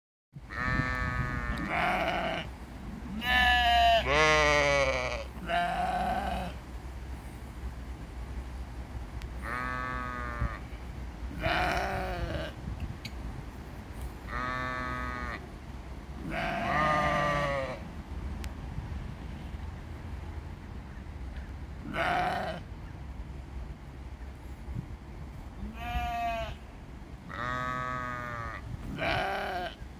Soundscapes > Nature
🌿🐑💚 Enjoy 2 minutes of uncut soundscape extracted from my original video that captures the peaceful countryside ambience as a small group of lambs graze and bleat softly in an open green field. Their gentle sounds mix beautifully with the rustling grass and faint whispers of wind; a soothing escape into nature’s quiet rhythm. 🌾✨ The moment was filmed with my phone (Samsung Galaxy s22) from a short distance. Thank you and enjoy!